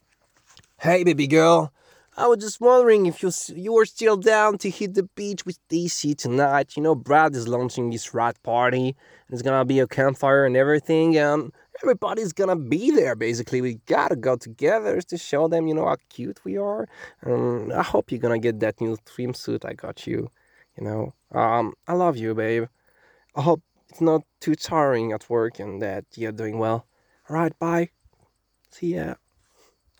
Speech > Solo speech
Surfer dude - Cringe BF voice message
Subject : Recording my friend going by OMAT in his van, for a Surfer like voice pack. Date YMD : 2025 August 06 Location : At Vue de tout albi in a van, Albi 81000 Tarn Occitanie France. Shure SM57 with a A2WS windshield on the left channel, and a Samsung A51 phone on the right channel. Weather : Sunny and hot, a little windy. Processing : Trimmed, some gain adjustment, tried not to mess too much with it recording to recording. Done inn Audacity. Some fade in/out if a oneshot.